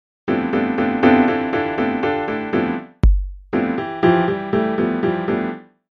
Music > Solo instrument
Me Piano
I drew myself in chrome music lab song maker and this is the result.
piano, chromemusic